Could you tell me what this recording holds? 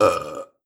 Sound effects > Human sounds and actions
HMNBurp-Samsung Galaxy Smartphone, CU Medium Nicholas Judy TDC
A medium burp.
Phone-recording, burp, medium, belch